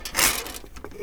Sound effects > Other mechanisms, engines, machines
metal shop foley -100
bam, bang, boom, bop, crackle, foley, fx, knock, little, metal, oneshot, perc, percussion, pop, rustle, sfx, shop, sound, strike, thud, tink, tools, wood